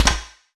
Sound effects > Electronic / Design
Attempt at opening a locked door. The lock and door rattle in their frame, but don't give way. Variation 1.
attempt, door, sealed, rattling, open